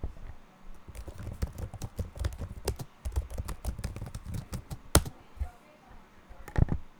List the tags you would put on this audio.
Sound effects > Objects / House appliances
Computer
Typing
clicking

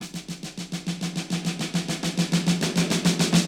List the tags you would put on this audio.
Music > Solo percussion
1lovewav
80s
analog
80s-drums
drums
snare
snare-build

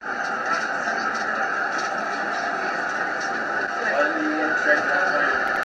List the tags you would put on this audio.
Sound effects > Vehicles
23
tram

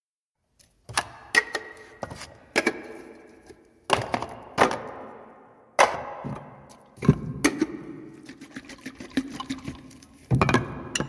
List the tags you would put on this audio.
Sound effects > Other mechanisms, engines, machines
WeaponReload
Free

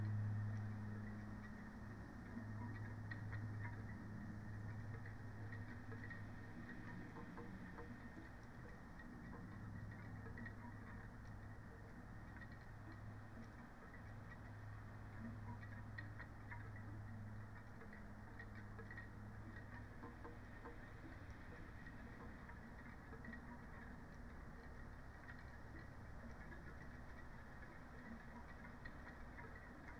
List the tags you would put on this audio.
Soundscapes > Nature
phenological-recording
sound-installation
natural-soundscape
nature
raspberry-pi
Dendrophone
modified-soundscape

